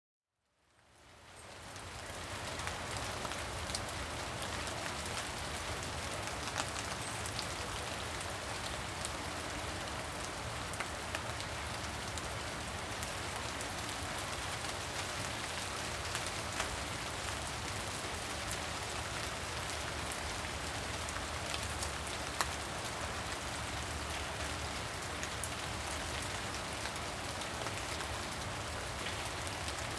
Soundscapes > Urban
You can hear light to moderate rain. Recorded in the city of Munich, in a garden. The microphone was placed in a wooden garden house, with the door open for the recording. Near the garden house, there was a tree with many leaves on which the rain was falling. Occasionally, you can hear birds chirping and public transportation, but very subtly. The main sound is the rain.